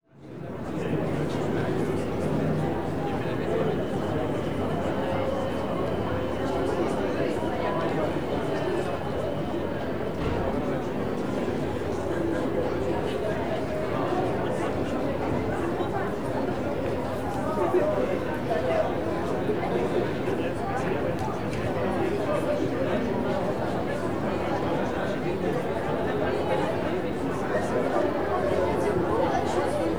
Soundscapes > Other
parents' meeting at a school
A parents' meeting at their children's school on a Friday evening in Lyon. Recorded with a ZOOM H4N pro
child, professor